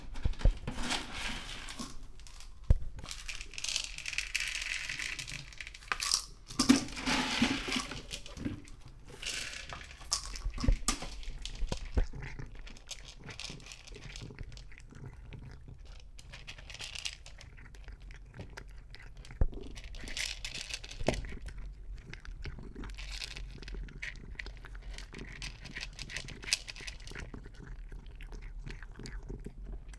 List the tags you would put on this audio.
Sound effects > Animals
bowl cat chew dry eating food into purring Serving

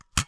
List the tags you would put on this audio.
Sound effects > Objects / House appliances
Bracket Light Spring Tone

